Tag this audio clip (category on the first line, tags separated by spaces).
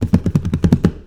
Sound effects > Objects / House appliances
pail bucket lid household tool handle kitchen container object garden clang pour scoop fill slam shake metal tip debris spill foley cleaning knock clatter plastic carry liquid drop water hollow